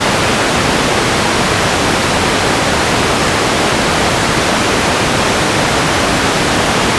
Instrument samples > Synths / Electronic
Noise Oscillator - Roland MKS-80